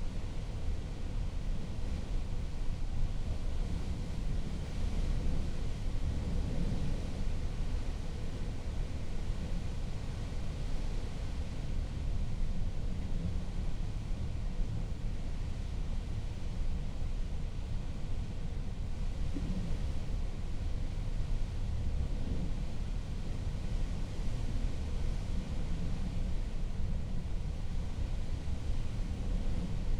Soundscapes > Other
Foghorn sounding in the middle of the North Sea. Recorded from the rear of the ship, foghorn at the front.